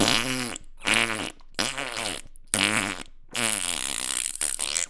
Sound effects > Human sounds and actions
wet fart sounds
Made this with my mouth :D